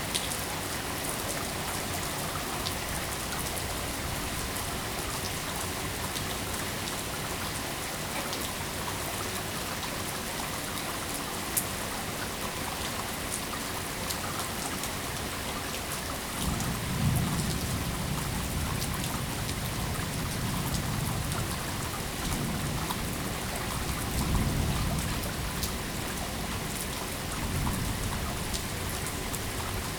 Sound effects > Natural elements and explosions
Sounds of rain running off a roof with occasional distant thunder.
Runoff, Rain, Thunder